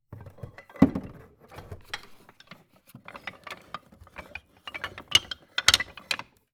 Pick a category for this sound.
Music > Solo instrument